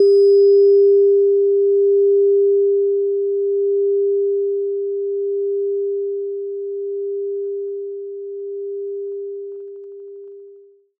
Sound effects > Objects / House appliances
Pipe Hit 6 Tone
Hitting a large hollow metal pipe, recorded with an AKG C414 XLII microphone.